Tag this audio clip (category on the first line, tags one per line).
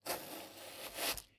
Sound effects > Other
Home
Indoor
Cook
Chef
Cut
Knife
Slice
Kitchen
Vegetable
Cooking
Chief